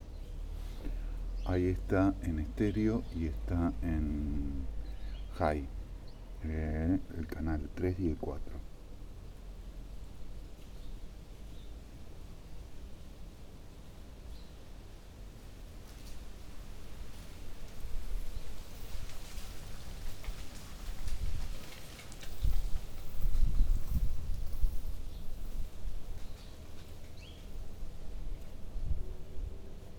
Soundscapes > Urban
Car passing – Dog barks
Tres autos pasando por calle de pueblo, perro ladra luego otro más pequeño unos pasos sobre hojas (Tascam D60d A+B) Estereo | Three cars pass along a village street, dog barks. Then a smaller one, a few steps on leaves (Tascam D60d A+B) Stereo.
bark, dog, car